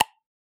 Sound effects > Objects / House appliances
Ribbon Trigger 7 Tone
A stretched satin ribbon, played like a string, recorded with a AKG C414 XLII microphone.